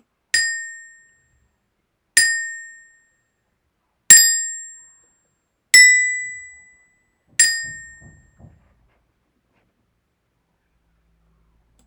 Instrument samples > Percussion
Glockenspiel samples. (MacBookAirM1 microphone in Reaper’s DAW)